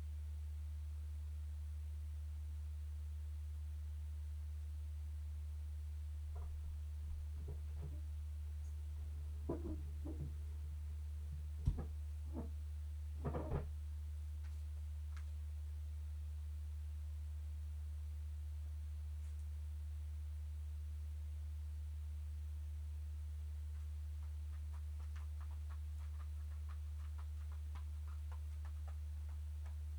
Sound effects > Animals
Cat-flap 5
Subject : A cat flap. it's in between two other doors/cat flaps the cats need to go though. Date YMD : 2025 September 04 In the early morning a bit after 3am. Location : Gergueil 21410 Bourgogne-Franche-Comté Côte-d'Or France. Hardware : DJI Mic 3 TX. Onboard recorder "Original" / raw mode. Weather : Processing : Trimmed and normalised in Audacity.
cat-flap, France